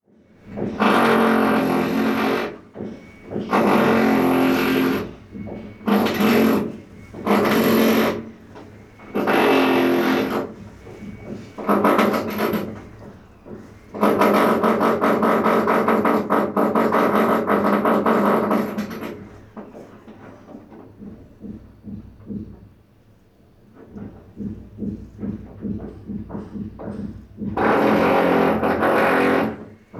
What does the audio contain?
Sound effects > Other mechanisms, engines, machines
Heavy drilling and hammering works in apt. Zone 1
Three neighboring apartments decided to remodel simultaneously, and far from being angry, I decided to record a few minutes of the torture and share it with all of you, sonic nerds of the world. Perhaps the annoyance that will accompany me for a month will be useful to someone who needs this for a project. The package includes three zones: the hammering zone, the drilling zone, and an intermediate zone where both fight equally to drive the other neighbors crazy. Enjoy the horror.
constructing, remodel, drilling, hammering, tools, demolition